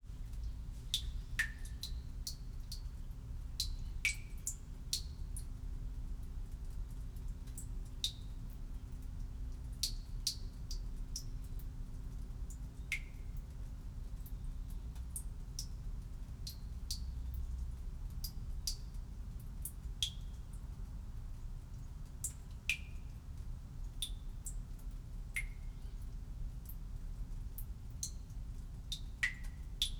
Soundscapes > Other
Drain Tunnel Water Drips and Breathing Resonance – Ganghwa Gwangseongbo
Recorded at Gwangseongbo, Ganghwa Island, Korea. A small concrete drain passage where outside ambience leaks in, water droplets create an irregular rhythmic pattern, and occasional low-frequency resonances emerge from deeper inside the drain, sometimes resembling a distant “breath”.
resonance, ambience, concrete, tunnel, storm-drain, drain, ganghwa, field-recording, subterranean, korea, water-drips